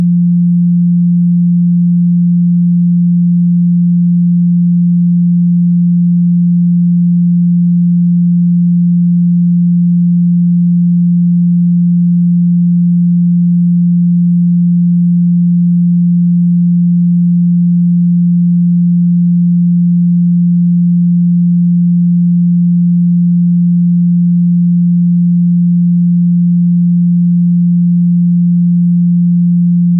Sound effects > Other
This is a tone I generated from myrelaxation.online